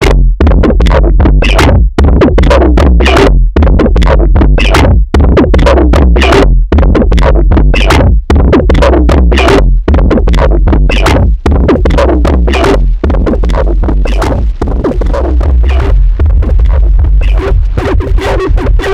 Music > Multiple instruments
experimental kicks 152bpm loop
sum hardcore kicks